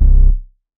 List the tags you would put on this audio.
Instrument samples > Synths / Electronic
bass
dirty
electronic
hip
trap

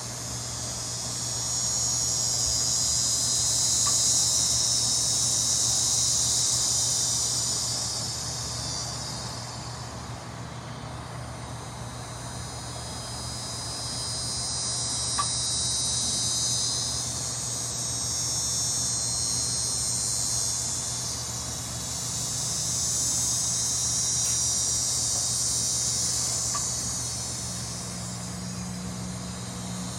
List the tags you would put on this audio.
Soundscapes > Nature
ambient
bug
cicada
insect

